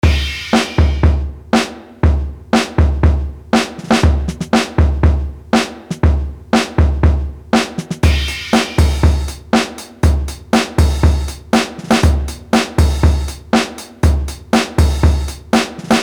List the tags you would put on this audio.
Music > Solo percussion
Drum
Sample

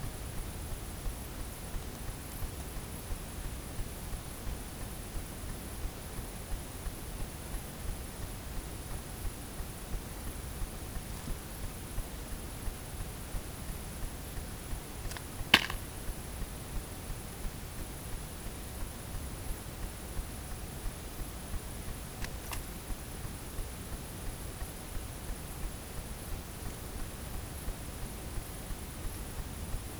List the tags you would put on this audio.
Soundscapes > Nature
ambiance
Cote-dor
country-side
Tascam
ambience
Zoom
rural
H2n
nature
forest
Gergueil
night
21410
valley
France
XY
combe
field-recording

